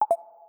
Sound effects > Electronic / Design
Simple or Cute UI / UX / Interface Cancel sound
Sound I made for my game - good for interface actions: cancel, back, reset, no Base sound made with the sfxr plugin and then edited in audacity.